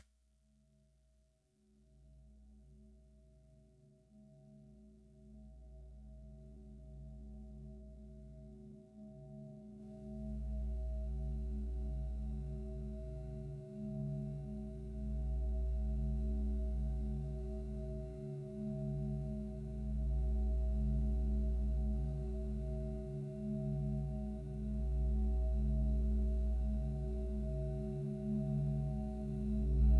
Music > Multiple instruments
Atonal Ambient Texture #005 - Profound
Experiments on atonal melodies that can be used as background ambient textures. AI Software: Suno Prompt: atonal, non-melodic, low tones, reverb, background, ambient, noise
ai-generated, ambient, atonal, experimental, pad, soundscape, texture